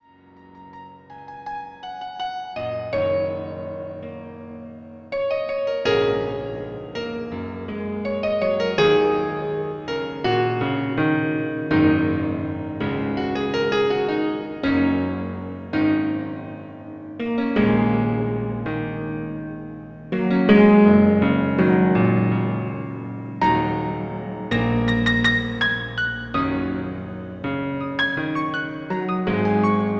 Music > Solo instrument
uplift Piano riff 82bpm F#
a chill evolving piano melody, chords on sharps , played and recorded in FL Studio, processed in Reaper <3
ambience, ambient, arrangement, beat, boof, chill, composition, contemplative, flstudio, free, groovy, happy, key, keys, loop, loopable, love, medatative, melody, music, orchestral, piano, pianoloop, pianos, solo, upflifting